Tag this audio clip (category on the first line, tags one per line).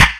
Instrument samples > Percussion
percussion
cymbal
metallic
crash
brass
bronze
Meinl
china
hi-hat
drum
cymbals
feeble-hat
Sabian
drums
Paiste
Zildjian
metal
Zultan